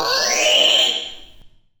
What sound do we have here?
Sound effects > Experimental
Creature Monster Alien Vocal FX (part 2)-029
howl, gross, otherworldly, devil, demon, snarl, fx, Alien, Monster, weird, Sfx, mouth, Creature, growl, zombie, bite, dripping, grotesque